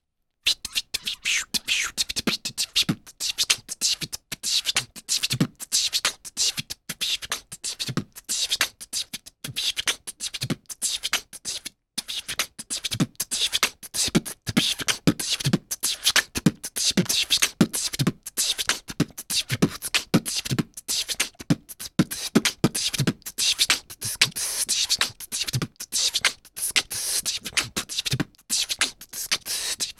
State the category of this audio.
Music > Solo percussion